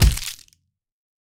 Sound effects > Electronic / Design
Skull Split #1
This one is short.
bludgeon-hit, bludgeoning-attack, head-stomp-sound, head-stomp, big-hit, head-crack-attack, hammer-hit, skull-split, crack-skull, skull-shatter, stomp-on-head, bludgeon, huge-hammer-hit, skull-stomp, skull-crack-open, massive-hammer-hit, smash-head, head-smash-attack, head-smash, curb-stomp-skull, skull-crush-sound, bludgeoning-hit, big-hammer-hit, bludgeon-head, crack-skull-open, skull-shatter-hit, head-crack, vicious-head-stomp, head-crack-open, skull-crush